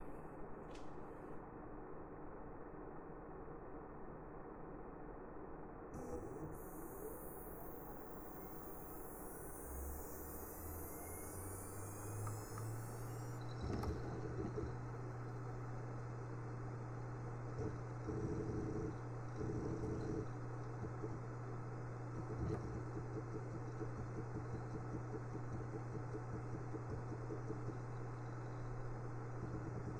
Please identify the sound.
Sound effects > Electronic / Design
4 Disk Storage Drive Wake up And Write
A 2010s 4 Disk Synology Hard Drive beginning to receive new files after a standby period. The drive is already powered on and it’s twin fans are running, but when the file transfer starts the system goes from standby to writing mode as code is inscribed onto its whirling disks.